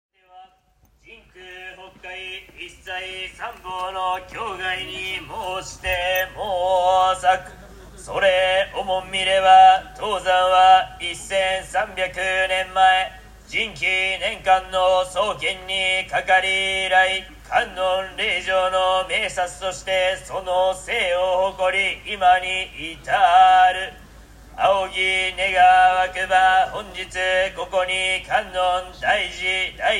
Music > Other
Temple of the Winning Daruma
I recorded this sound in the garden around the Katsuo-ji Temple in Osaka-Minoh. It was a call to attend a service in the temple. The Katsuo-ji Temple is a stunning spot tucked away in the forested mountains north of Osaka, home to thousands of Daruma dolls and some seriously spectacular natural scenery. The temple features thousands of Daruma dolls, covering almost every flat surface and hidden away between stones, tree branches and almost every other spot imaginable. These distinctive red dolls are a symbol of good luck. Visitors flock here across Japan to pray for luck in their work, studies, relationships, health and everything in between.
Daruma, japan, Katsuo-ji, minoh, Osaka, Temple